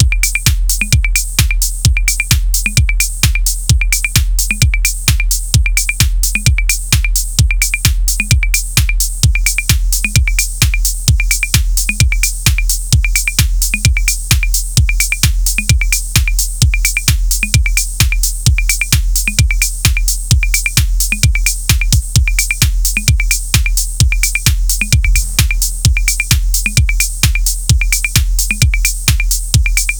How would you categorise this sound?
Music > Other